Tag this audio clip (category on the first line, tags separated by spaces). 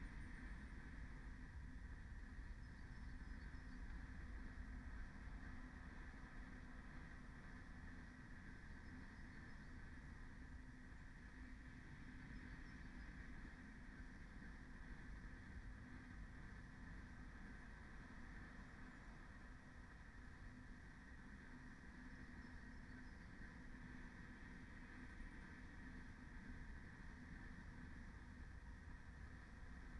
Soundscapes > Nature
soundscape raspberry-pi data-to-sound phenological-recording sound-installation natural-soundscape field-recording alice-holt-forest artistic-intervention nature modified-soundscape Dendrophone weather-data